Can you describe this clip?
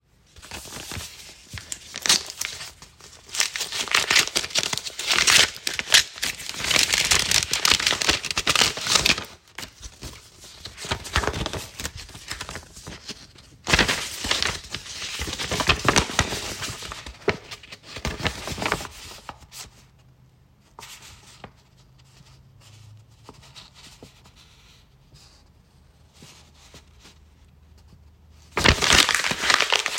Sound effects > Objects / House appliances
Opening Envelope Letter Mail
Standard business envelope being opened, and then enclosed paper document removed, unfolded, and ultimately crumpled up.